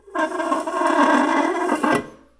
Sound effects > Objects / House appliances
aluminum can foley-007
can, sfx, fx, foley, scrape, water, metal, alumminum, household, tap